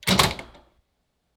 Sound effects > Objects / House appliances
Door Unlock
The audio has been noise-cleaned and professionally prepared for further editing, sound design, or integration into any project. Perfect for use in films, games, foley work, or UI sound effects.
clean-sound, close, door, doors, free, key, keys, lock, mastered, open, unlock, zoom